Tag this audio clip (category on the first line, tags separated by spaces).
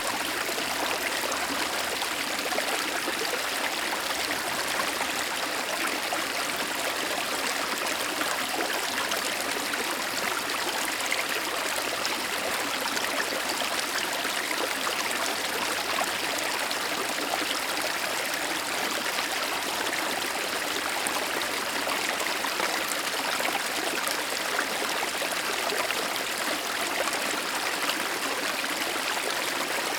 Sound effects > Natural elements and explosions
brook creek field-recording MV88 Shure stream water